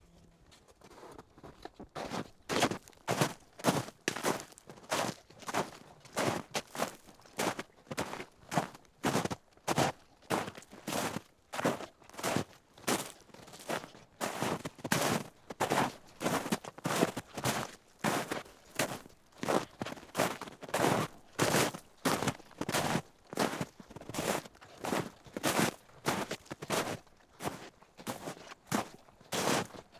Sound effects > Human sounds and actions
footsteps snow 2
footsteps on snow recorded with zoom h6